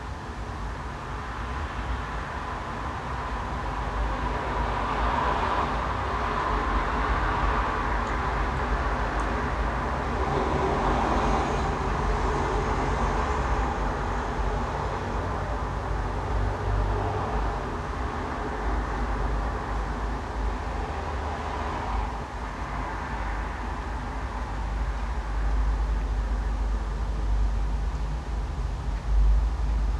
Soundscapes > Indoors
AMB-Roomtone, Interior, CityTraffic - Tallinn, Estonia - 9Nov2025,1641H
Roomtone recorded inside an apartment with open window in Tallinn, Estonia. Recorded using iPhone 14 internal microphone and processed in iZotope RX.